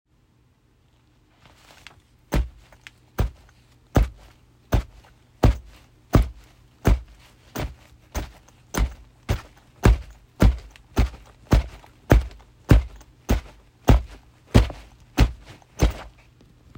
Sound effects > Human sounds and actions
footsteps indoor
walking inside thether room
floor, footsteps, rug, walking